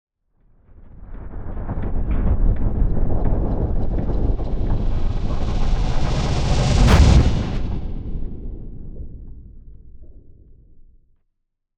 Sound effects > Other
Sound Design Elements SFX PS 069
game, metal, whoosh, explosion, indent, deep, sweep, reveal, implosion, tension, stinger, cinematic, riser, industrial, movement, transition, trailer, hit, impact